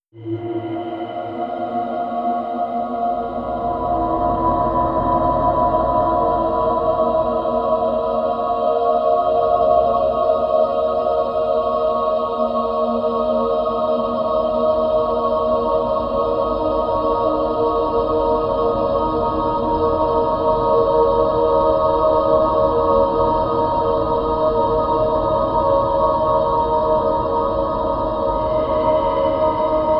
Music > Other
Dark Mystical atmosphere - Healing / Ghostly Presence
An eerie female choir with layered breath effects, bells, and distant percussion. If you're looking to explore ruins, an abandoned castle, a crumbling ancient cathedral, a dungeon suddenly bathed in a mystical light, a forgotten temple, or set the scene for an uncertain healing ceremony, this atmosphere could be very useful. The melody has something soothing but also strange and disturbing. DAW : Audacity. No pre-made loops. VSTs : Paulstretch. Instruments : Female choir AI-generated with Myedit AI and paulstretched factor 6, Japanese drum, Bamboo hit (reversed and paulstretched), whoosh effect. BPM 147 (but the track hasn't been processed with quantization). Key : D minor * Music and experimental sounds.